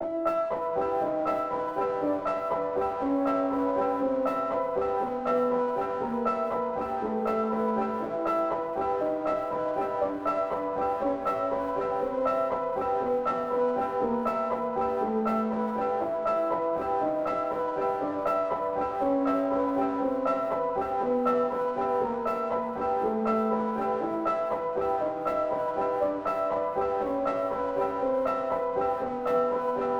Music > Solo instrument
Piano loops 188 efect 3 octave long loop 120 bpm
120, piano